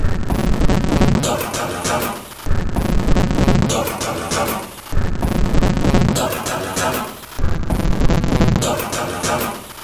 Instrument samples > Percussion
This 195bpm Drum Loop is good for composing Industrial/Electronic/Ambient songs or using as soundtrack to a sci-fi/suspense/horror indie game or short film.
Alien, Ambient, Dark, Drum, Industrial, Loop, Loopable, Packs, Samples, Soundtrack, Underground, Weird